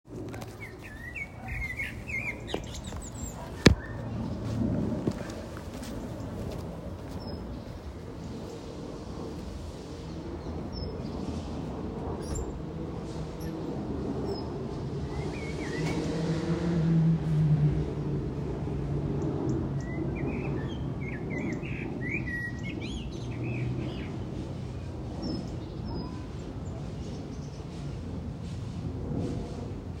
Soundscapes > Urban
Blackbird in English suburb.
the lovely blackbird doing its song in a London suburb , springtime , some annoying parrots at about 6 mins